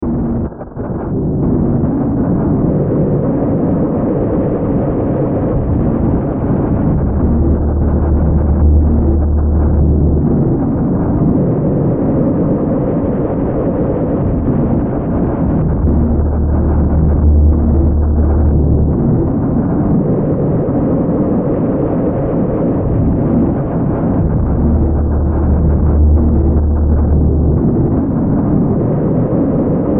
Soundscapes > Synthetic / Artificial
Looppelganger #189 | Dark Ambient Sound
Use this as background to some creepy or horror content.
Darkness, Sci-fi, Noise, Silent